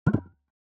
Sound effects > Electronic / Design
CLICKY ANALOG METALLIC ERROR
SHARP, INNOVATIVE, UNIQUE, COMPUTER, CIRCUIT, OBSCURE, BOOP, HIT, SYNTHETIC, DING, HARSH, ELECTRONIC, BEEP, EXPERIMENTAL, CHIPPY